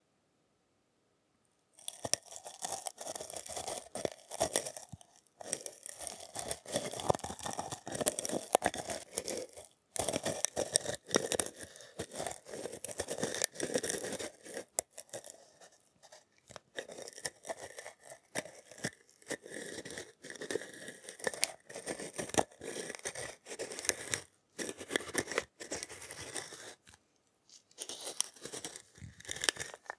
Sound effects > Other
Spice Grinding
added an EQ, noise reduction, and compression just to clean the audio up!
beans, coffee, cook, cooking, grinder, grinding, kitchen, mortar, spices